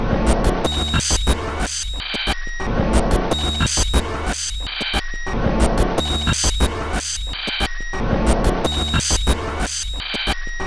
Sound effects > Experimental
This 180bpm Glitch Loop is good for composing Industrial/Electronic/Ambient songs or using as soundtrack to a sci-fi/suspense/horror indie game or short film.
Alien, Ambient, Dark, Drum, Industrial, Loop, Loopable, Packs, Samples, Soundtrack, Underground, Weird